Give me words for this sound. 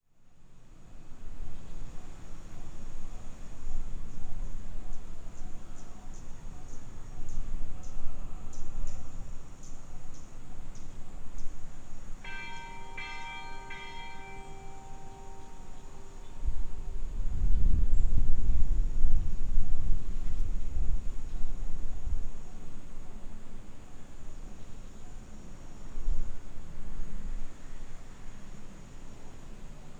Soundscapes > Other
250811 115819 PH San Agustin bell ringing at 12 noon
San Agustin bell ringing at 12 noon. I recorded this file during an unusually calm midday, from the terrace of a house located at Santa Monica Heights, which is a costal residential area near Calapan city (oriental Mindoro, Philippines). One can hear the bell of the nearby seminary ringing, with a bit of wind (unfortunately). Recorded in August 2025 with a Zoom H6essential (built-in XY microphones). Fade in/out applied in Audacity.
ambience, atmosphere, bell, birds, breeze, Calapan-city, calm, church, church-bell, field-recording, Philippines, ring, ringing, San-agustin, seminary, soundscape, wind